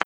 Sound effects > Electronic / Design
Dot click
Ball, Click, Dot, Writing